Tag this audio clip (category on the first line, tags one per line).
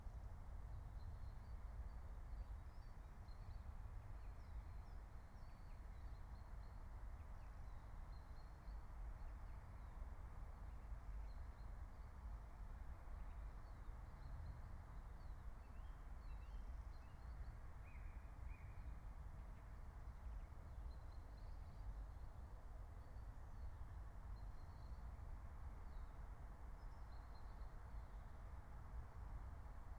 Soundscapes > Nature
raspberry-pi
alice-holt-forest
phenological-recording
meadow
natural-soundscape
field-recording
nature
soundscape